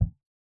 Sound effects > Human sounds and actions
FabricArmonTable 1 Perc
Coincidental percussive sample of my arm in a sweater hitting the table while recording with an AKG C414 XLII microphone.